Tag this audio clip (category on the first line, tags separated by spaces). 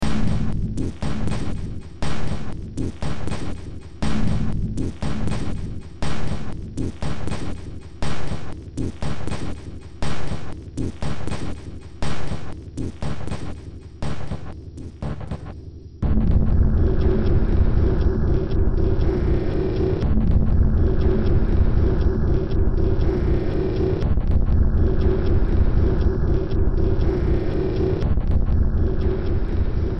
Music > Multiple instruments
Ambient Sci-fi Soundtrack Underground Industrial Horror Games Noise Cyberpunk